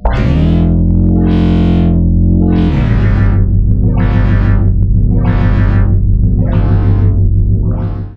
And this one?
Instrument samples > Synths / Electronic
Jarbled Sub
a nasty jarbled low end sub oneshot, nasty bassy gritty synth created on FL Studio and processed with Reaper